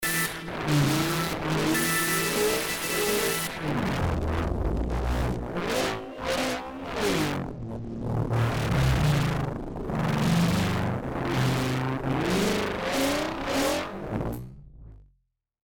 Sound effects > Experimental
oDD sYNTHETIC sOUNDS
I Made this with a bunch of effects thrown onto a little sound chop I recorded from my Casio CTK-150 which I ran through a guitar amp, then from my microphone to my DAW.
electro, electronic, synth